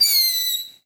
Sound effects > Natural elements and explosions
FRWKRec-Samsung Galaxy Smartphone, CU Firework Whistle, Short 01 Nicholas Judy TDC
A short firework whistle.
firework, whistle, Phone-recording, short